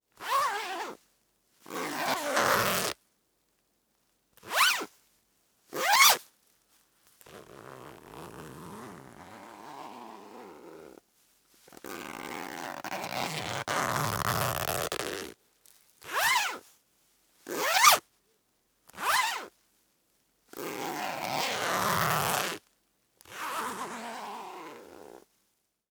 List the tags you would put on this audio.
Sound effects > Objects / House appliances
bag
closure
clothes
crack
fastener
zipper

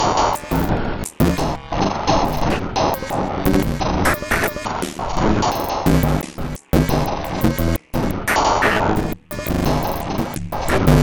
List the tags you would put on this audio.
Instrument samples > Percussion
Dark
Packs
Alien
Ambient
Loop
Industrial
Soundtrack
Underground
Drum
Loopable
Weird
Samples

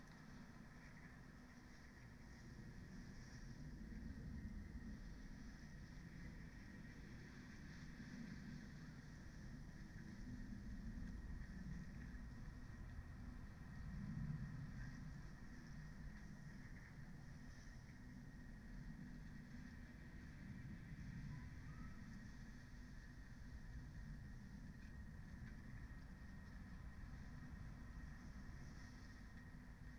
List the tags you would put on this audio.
Soundscapes > Nature
raspberry-pi
modified-soundscape
nature
artistic-intervention
sound-installation
field-recording
Dendrophone

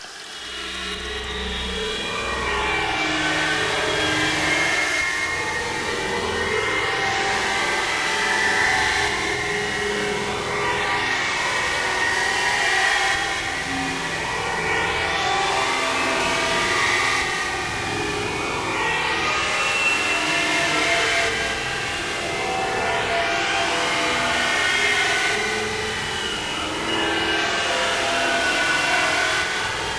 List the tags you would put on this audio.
Soundscapes > Synthetic / Artificial
texture
rumble
effect
synthetic
drone
shimmering
ambience
landscape
fx
roar
glitch
glitchy
shimmer
alien
shifting
slow

